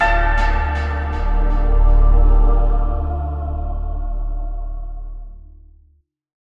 Music > Multiple instruments
Dramatic Sting (Sudden Realization)
A lot of effort and time goes into making these sounds. A simple dramatic sting. The high-pitched chord in it is a recording of a bobbling note on a piano I'm learning piano tuning on. Produced with Ableton.